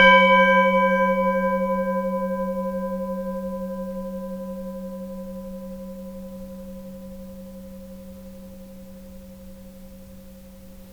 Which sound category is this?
Instrument samples > Other